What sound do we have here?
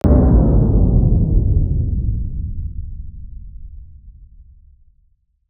Sound effects > Electronic / Design
Power Down 4
all-stop, boulder-punching-asshole, deactivate-machine, machine-deactivate, machine-off, power-down, power-off, power-outage, shut-down, SilverIlusionist, slow-down